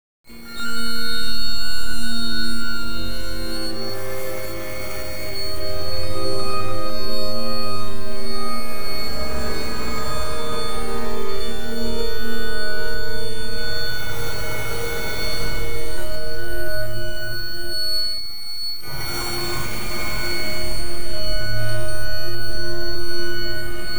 Soundscapes > Synthetic / Artificial
These are my first experiments with a granulator. I believe there will be more volumes. Sounds are suitable for cinematic, horror, sci-fi film and video game design.